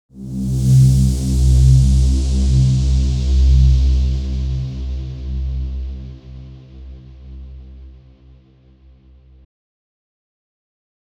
Instrument samples > Synths / Electronic
Deep Pads and Ambient Tones8
Pads, Synth, bass, Deep, Chill, Tones, Oneshot, Ominous, bassy, Tone, synthetic, Pad, Synthesizer, Dark, Note, Analog, Digital, Ambient, Haunting